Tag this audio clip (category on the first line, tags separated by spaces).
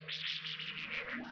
Soundscapes > Synthetic / Artificial
LFO massive Birsdsong